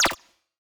Sound effects > Electronic / Design
POWERFUL ELECTRIC BRIGHT TONE
BEEP, BOOP, CHIPPY, CIRCUIT, COMPUTER, DING, ELECTRONIC, EXPERIMENTAL, HARSH, HIT, INNOVATIVE, OBSCURE, SHARP, SYNTHETIC, UNIQUE